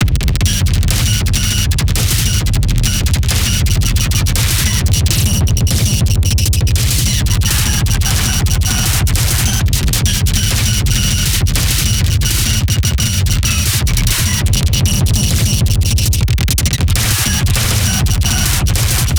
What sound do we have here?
Music > Multiple instruments
lasereye 200bpm
heavy drums processed with gross beat